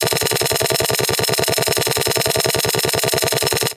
Sound effects > Electronic / Design
SFX BoomerangFlight
A rotating something in mid-flight. Catch!
projectile, rotating, flight, boomerang, oscillating